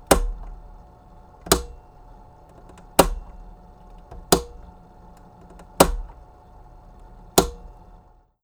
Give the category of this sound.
Sound effects > Other mechanisms, engines, machines